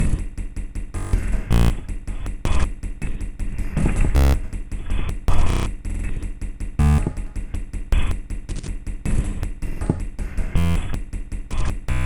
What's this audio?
Instrument samples > Percussion
This 159bpm Drum Loop is good for composing Industrial/Electronic/Ambient songs or using as soundtrack to a sci-fi/suspense/horror indie game or short film.
Underground, Dark, Weird, Drum, Loopable, Industrial, Samples, Packs